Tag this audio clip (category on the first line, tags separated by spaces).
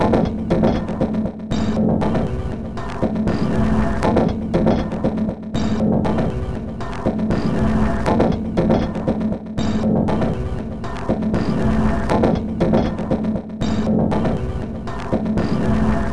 Instrument samples > Percussion
Loopable Samples Loop Industrial Packs Weird Dark Ambient Drum Soundtrack Alien Underground